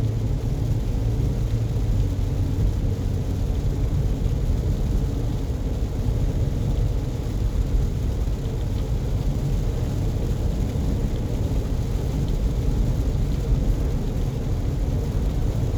Soundscapes > Indoors
RAINInt-Samsung Galaxy Smartphone, CU Rainy Night In Car Nicholas Judy TDC
A rainy night in a car.